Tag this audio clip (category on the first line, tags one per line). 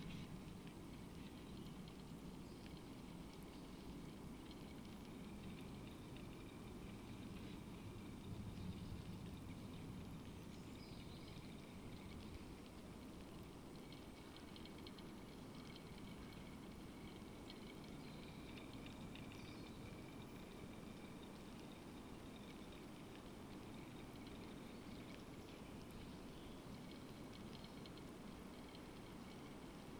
Soundscapes > Nature
sound-installation
alice-holt-forest
nature
weather-data
Dendrophone
modified-soundscape
artistic-intervention
phenological-recording
natural-soundscape
raspberry-pi
field-recording
soundscape
data-to-sound